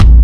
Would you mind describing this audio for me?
Instrument samples > Percussion
fat kick 2b
bass-drum, headsound, death-metal, fat-drum, thrash-metal, hit, rock, attack, bassdrum, fat-kick, natural, fatkick, mainkick, headwave, kick, fatdrum, thrash, metal, percussive, pop, groovy, percussion, Pearl, trigger, bass, drum